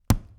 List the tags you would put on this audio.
Sound effects > Experimental
punch
bones
vegetable
foley
thud
onion

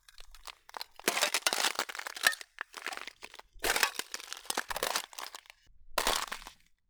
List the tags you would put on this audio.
Sound effects > Other
snow
ice
cold
winter
frost
crushing

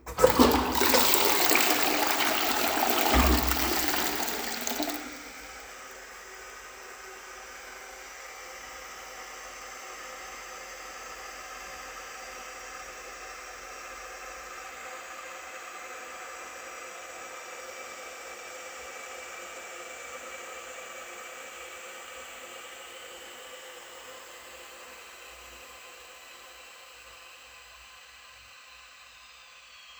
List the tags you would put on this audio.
Sound effects > Objects / House appliances
flushing
toilet
fx
water
wc
sfx
flush
bathroom
effect

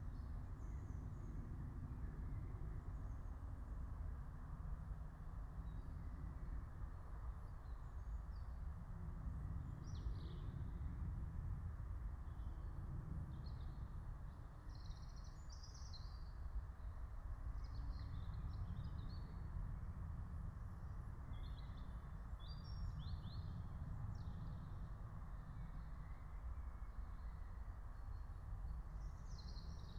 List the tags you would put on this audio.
Soundscapes > Nature
phenological-recording
natural-soundscape
field-recording
nature

